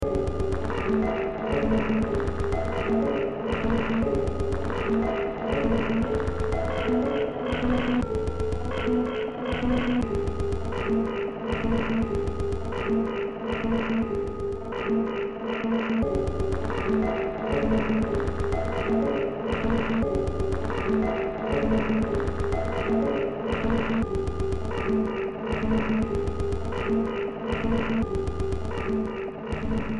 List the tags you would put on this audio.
Music > Multiple instruments
Sci-fi
Ambient
Noise
Horror
Industrial
Cyberpunk
Soundtrack
Games
Underground

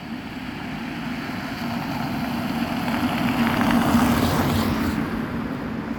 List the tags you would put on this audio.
Sound effects > Vehicles
studded-tires
moderate-speed
asphalt-road
passing-by
car
wet-road

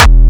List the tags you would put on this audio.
Instrument samples > Percussion
brazilianfunk
crispy
distorted
Kick
powerful
powerkick

